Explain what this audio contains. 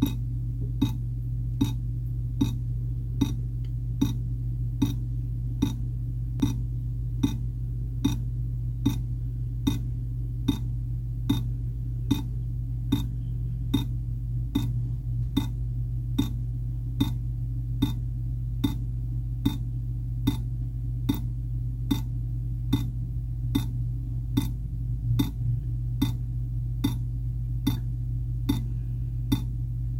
Sound effects > Natural elements and explosions
WATRDrip-Samsung Galaxy Smartphone, CU On Ceramic Plate Nicholas Judy TDC
Water dripping on a ceramic plate.